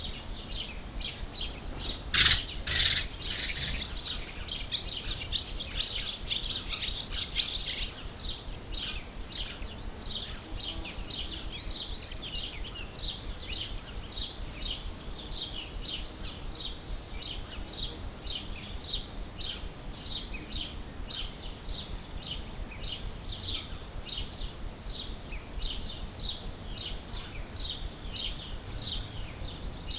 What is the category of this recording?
Sound effects > Animals